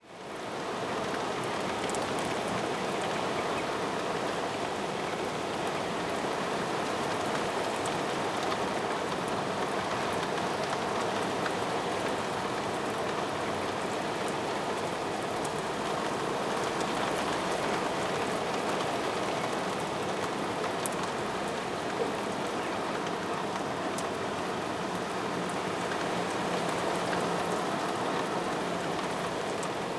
Soundscapes > Other
A short recording of a rain shower from a semi open window in my flat.